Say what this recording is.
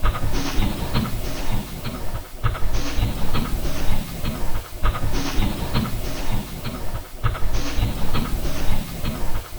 Instrument samples > Percussion
This 200bpm Drum Loop is good for composing Industrial/Electronic/Ambient songs or using as soundtrack to a sci-fi/suspense/horror indie game or short film.
Samples, Ambient, Industrial, Loop, Drum, Weird, Packs, Underground, Alien, Dark